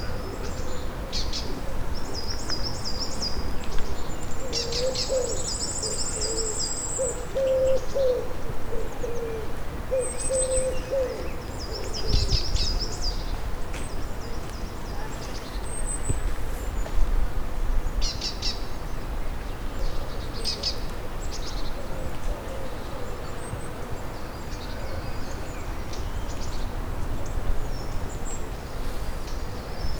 Soundscapes > Nature
20250312 Collserola Birds Quiet Calm
Birds, Calm, Collserola, Quiet